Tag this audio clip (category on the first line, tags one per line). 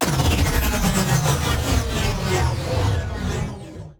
Sound effects > Electronic / Design
processed
hit
game-audio
evolving
sfx
Impact
electronic